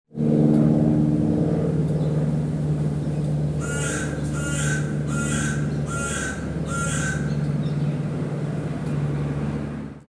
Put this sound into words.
Sound effects > Other mechanisms, engines, machines
Single-engine plane birds
A single-engine plane. Short flyover with birds entering.